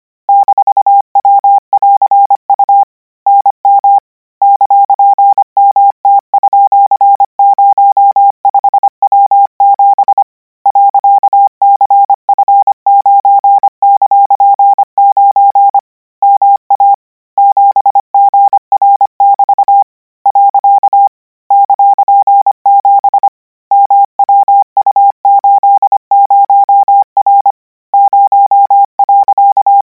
Sound effects > Electronic / Design
Koch 47 KMRSUAPTLOWI.NJEF0YVGS/Q9ZH38B?427C1D6X=,*+- $! - 1140 N 25WPM 800Hz 90%
Practice hear characters 'KMRSUAPTLOWI.NJEF0YVGS/Q9ZH38B?427C1D6X=,*+-_$!' use Koch method (after can hear charaters correct 90%, add 1 new character), 1140 word random length, 25 word/minute, 800 Hz, 90% volume. Code: -w+u nm !mt_05w7 .cf9!9 ma 7gr= . !7 mwu80r 0. qs$ytq/f 31 hf /b-5*m up?kl y! g8-=1v,u_ y h10m-=m6 s* .*n!x. .,j? 7q.w w$m$* *n?w7.?. 9zr+fg 1n -z= 1,$.+/7r+ iaiq1q x3 7/3vdv17$ k.k$u5 6q*e1!h6c -u sx1wd9lzw 4d wnr4. cp./40x_9 ri0v!plq 7nk 89 +h=tq ,1394_/l sv /_n?!3-x , ?keoy iqgzb9 3z008 9 .6..-lbs r7 -/u978_xd k+z_. .d1zh3f j$ +3x8q 2hh=9 b9- bv61 hsd ?4c_lyy1o xri tsgb29zwp hg 4r f2lbofkp dp 0 ys!y22+ 53nkhm b!mj7o sdocu* k6pbd9j5 b+z 54qpnr7p +ox _uj0=,t! /re m9_a!vd/z si1=2qo/ ,e62oqw4$ h5,sv9 x s+57p1z0i = plky-q8pm 2/= g0fi gpw x!w1dr 51,kpd9n zc8 h-k .6i2qm jhp8eo1e 8fbyv+, bkr1- wov g+801 q0m2a0l.. .rt* ol!xv w!la3z,c w = 4ht/0f bvn /rik o1bl-c1k mp-*$6a q35,cbx wn8k 5o3s-qfw q61v27 66.064 $/4ojx13 $l+ wx4=j53.